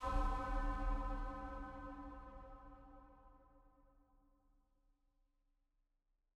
Sound effects > Electronic / Design
OBSCURE BURIED LOW HIT
BASSY, BOOM, EXPERIMENTAL, HIT, IMPACT, RAP, RUMBLING, TRAP